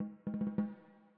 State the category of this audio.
Music > Solo percussion